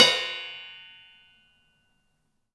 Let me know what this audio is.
Music > Solo instrument
Cymbal Grab Stop Mute-009
Crash, Custom, Cymbal, FX, Kit, Metal, Paiste, Perc, Ride